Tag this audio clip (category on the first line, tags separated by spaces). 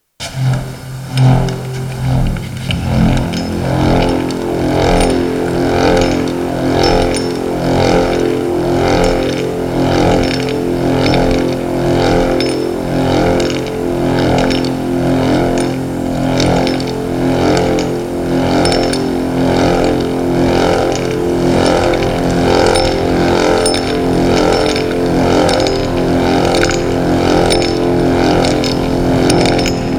Music > Solo instrument
Ambient Drone acoustic